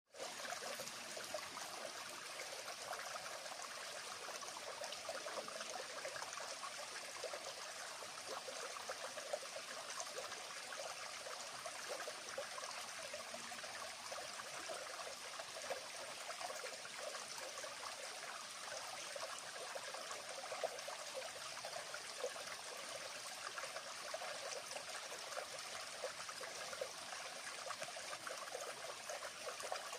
Soundscapes > Nature
Stream in Forest water closeup
Small Stream in a Forest
Liquid, Stream, Water